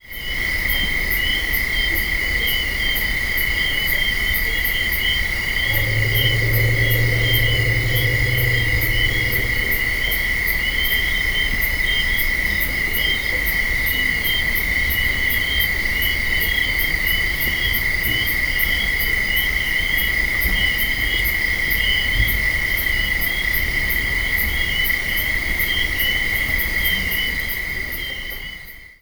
Soundscapes > Nature
Night in the Serengeti with elephant rumble
Night in the Serengeti. Countless crickets are chirping, some birds are still awake and tweeting. At #0:05, you can hear the characteristic rumble of an elephant. Recorded with an Olympus LS-14.